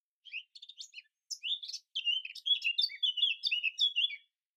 Sound effects > Animals
A morning recording of an Eurasian Blackcap. Edited in Rx11.
Bird, birds, blackcap, chirp, field-recording, morning, nature, songbird